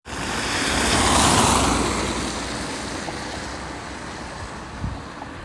Sound effects > Vehicles
car sunny 11
car, engine, vehicle